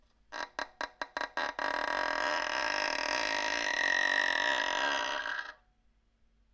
Instrument samples > String
Bowing broken violin string 1
horror, creepy, uncomfortable, strings, bow, beatup, violin, broken, unsettling